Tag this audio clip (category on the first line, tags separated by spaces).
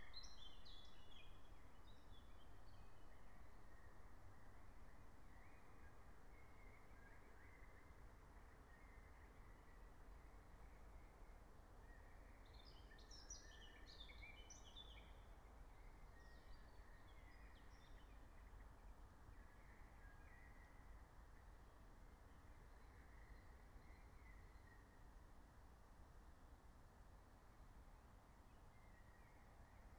Soundscapes > Nature
meadow soundscape nature alice-holt-forest natural-soundscape raspberry-pi phenological-recording field-recording